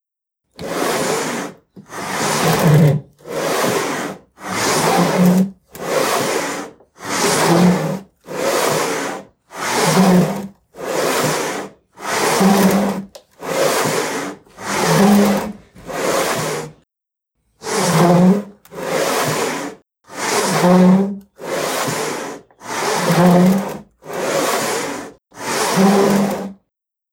Sound effects > Objects / House appliances
A wooden chair dragged along the ground. You can separate the sounds for a solo movement. * No background noise. * No reverb nor echo. * Clean sound, close range. Recorded with Iphone or Thomann micro t.bone SC 420.